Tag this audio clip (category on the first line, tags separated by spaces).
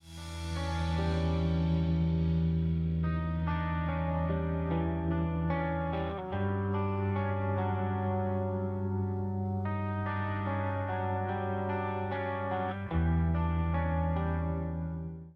Music > Other
BM depressive electric guitar sample